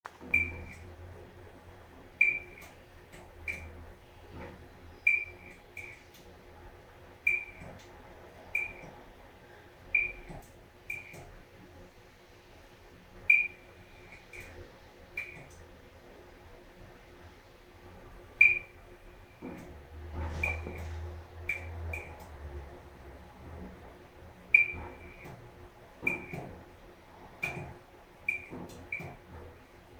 Sound effects > Objects / House appliances
The sound of a damaged fluorescent capacitor trying to turn on the light.